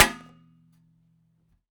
Sound effects > Objects / House appliances
Oven tray hit - Dji mic3
Subject : Hitting a oven tray with a mic mounted on it. Date YMD : 2025 December 15 Location : Albi 81000 Tarn Occitanie France. Hardware : DJI mic 3 TX onboard recording Weather : Processing : Trimmed and normalised in Audacity with a fade out.
metallic, oneshot, tinny, hit, metalic, cling, dji, metal, mic3, dji-mic3, oven-tray, percussion